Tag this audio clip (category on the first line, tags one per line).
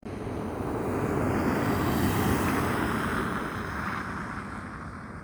Sound effects > Vehicles
engine
vehicle